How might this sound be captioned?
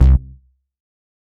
Instrument samples > Synths / Electronic
syntbas0020 C-kr
VSTi Elektrostudio (Model Mini) + 2xSynth1
bass, synth, vst, vsti